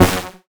Instrument samples > Synths / Electronic
CINEMABASS 2 Gb
fm-synthesis, bass